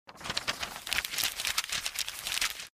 Sound effects > Objects / House appliances
Flipping book
This is an audio clip of flipping through a book
book, Flipping, page